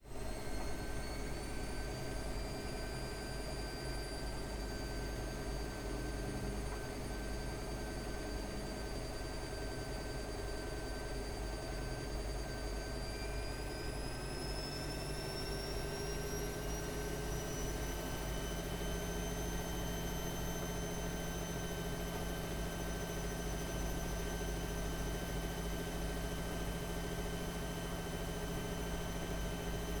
Sound effects > Objects / House appliances
Our washing machine doing its water-extraction business on clothes being laundered.